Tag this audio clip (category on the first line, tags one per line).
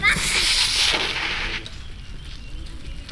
Sound effects > Vehicles
2025
81000
Albi
bicycle
Binaural
braking
break-lock
child
City
drift
France
FR-AV2
gravel
In-ear-microphones
ITD
june
kid
monday
Occitanie
OKM
OKM-1
OKM1
Outdoor
skid
Soundman
Tarn
Tascam